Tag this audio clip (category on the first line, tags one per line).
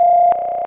Sound effects > Electronic / Design
alert
confirmation
digital
interface
message
notification
selection